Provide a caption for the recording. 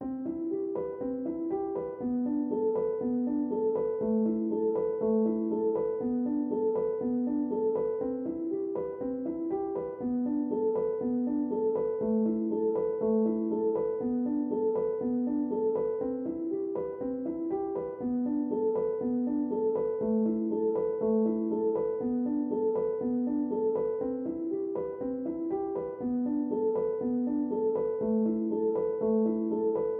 Music > Solo instrument
Piano loops 192 octave down short loop 120 bpm
120, 120bpm, free, loop, music, piano, pianomusic, reverb, samples, simple, simplesamples